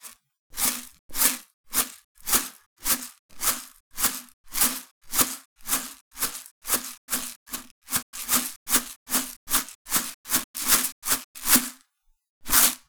Sound effects > Objects / House appliances
Some rice being shook in a plastic container, originally used as a base for the sound of a locomotive